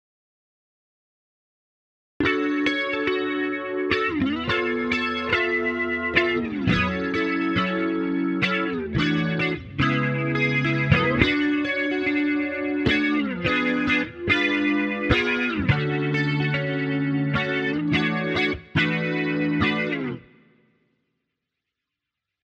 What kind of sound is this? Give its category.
Music > Solo instrument